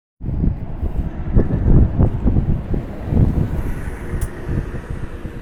Sound effects > Vehicles
A bus passes by
bus, bus-stop, Passing